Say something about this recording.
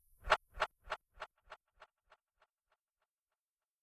Sound effects > Other mechanisms, engines, machines
tickC echo slowed
One of multiple variations. Meant to work as a slow down/ rewind effect.
second, rewind, clock, ticking, seconds, hand, tick-tock, slow, echo, down, time, ticks, reverb, clacking, minute